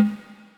Music > Solo percussion
Snare Processed - Oneshot 188 - 14 by 6.5 inch Brass Ludwig
acoustic, beat, brass, crack, drum, drumkit, drums, flam, fx, hit, hits, kit, ludwig, oneshot, perc, percussion, processed, realdrum, realdrums, reverb, rim, rimshot, rimshots, roll, sfx, snare, snaredrum, snareroll, snares